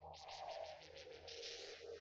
Soundscapes > Synthetic / Artificial
LFO Birdsong 16
birds, Lfo, massive